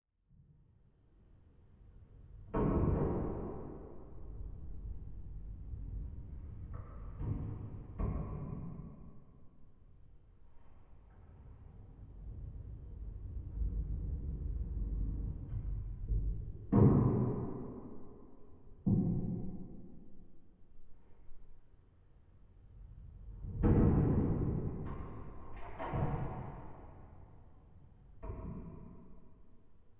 Sound effects > Other
AMBIndoor Cinematis HalloweenSpecial Vol5 Basement Movement 4 Freebie
Strange, unsettling noises echoing up from a dark, creepy basement. This is one of the three freebies from my Halloween Special | Vol.5 pack.